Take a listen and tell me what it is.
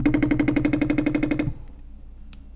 Sound effects > Objects / House appliances
Metal Beam Knife Plank Vibration Wobble SFX 13
Beam, Clang, ding, Foley, FX, Klang, Metal, metallic, Perc, SFX, ting, Trippy, Vibrate, Vibration, Wobble